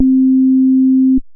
Instrument samples > Synths / Electronic
Yamaha FM-X engine Waveform